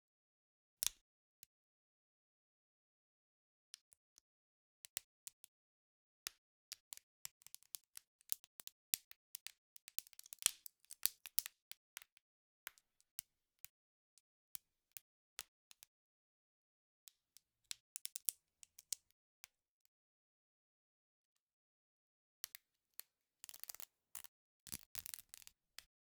Sound effects > Natural elements and explosions
Sparks mediumDensity1
Processed cracking bark to simulate sparks. To be added for a campfire atmosphere in a soundscape. Studio-Recording; recorded on a Røde NTG 2, MOTU M2 and Reaper. Sound processing: INA GRM Tools Shuffle.